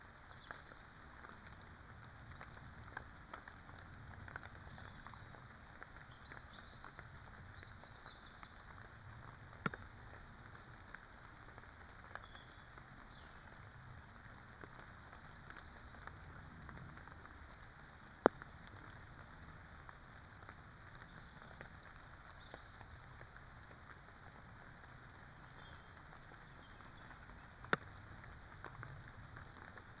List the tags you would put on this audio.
Soundscapes > Nature
data-to-sound
nature
raspberry-pi
weather-data
modified-soundscape
phenological-recording
alice-holt-forest
artistic-intervention
soundscape
Dendrophone
field-recording
sound-installation
natural-soundscape

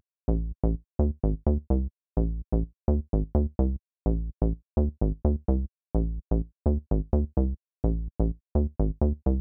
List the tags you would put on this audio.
Music > Solo instrument
303
Acid
hardware
house
Recording
Roland
synth
TB-03
techno

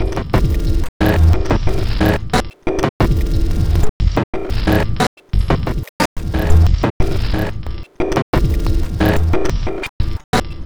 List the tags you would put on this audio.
Instrument samples > Percussion
Samples
Soundtrack
Weird

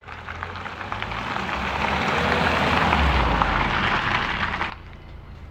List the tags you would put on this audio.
Sound effects > Vehicles
driving
car
combustionengine

